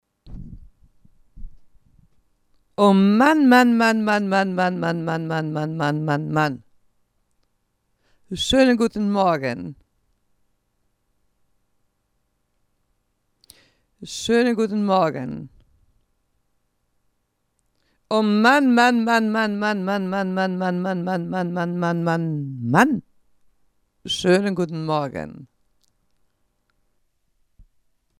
Speech > Conversation / Crowd
O Mann Mamm Mann, Schönen Guten Morgen
woman, girl, voice, german, fx, background, talk, female, vocal, speak